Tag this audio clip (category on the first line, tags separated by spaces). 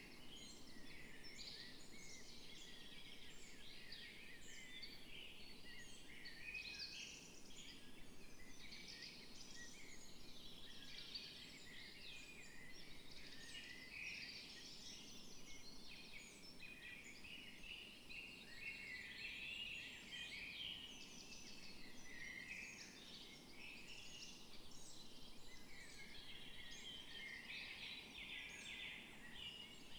Soundscapes > Nature
alice-holt-forest modified-soundscape natural-soundscape nature